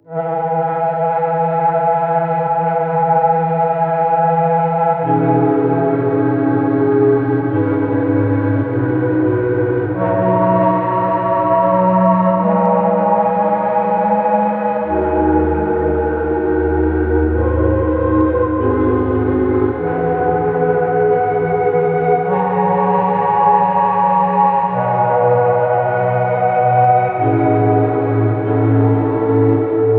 Music > Other
A Most Despicable Sound (195 BPM, Horror/Suspense)
Made with FL Studio 2025. Used arpeggio generation with a flute like sound effect created in Morphine.
weird, anxious, scary, eldritch, strange, arpeggio, suspense